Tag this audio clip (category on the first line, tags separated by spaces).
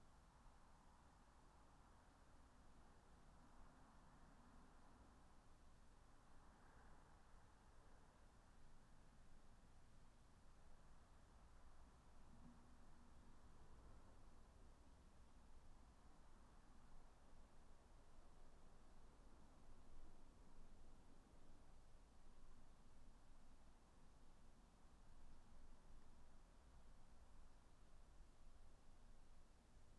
Soundscapes > Nature
alice-holt-forest nature weather-data data-to-sound soundscape modified-soundscape raspberry-pi natural-soundscape artistic-intervention field-recording phenological-recording sound-installation Dendrophone